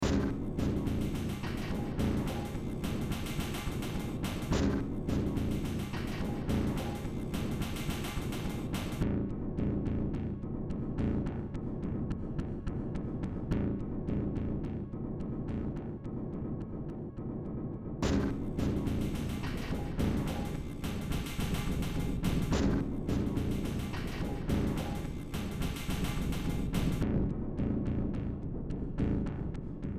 Music > Multiple instruments
Demo Track #3660 (Industraumatic)
Noise, Horror, Sci-fi, Ambient, Games, Soundtrack, Underground, Cyberpunk, Industrial